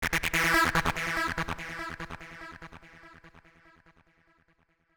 Sound effects > Electronic / Design
Psytrance One Shot 05
psy, lead, goa-trance, goa, goatrance, 145bpm, psy-trance, psytrance, trance